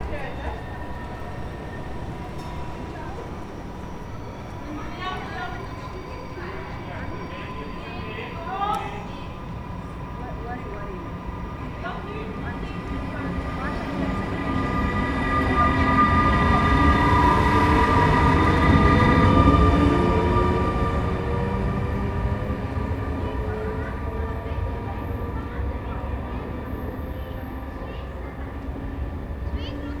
Soundscapes > Urban
Recording of passing train at the station platform
passing, railway, station, train, voices